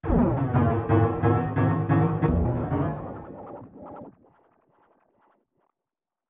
Music > Other
Unpiano Sounds 008
Samples of piano I programmed on a DAW and then applied effects to until they were less piano-ish in their timbre.
Distorted, Piano